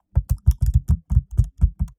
Sound effects > Objects / House appliances
Pressing keys on a laptop keyboard, recorded with an AKG C414 XLII microphone.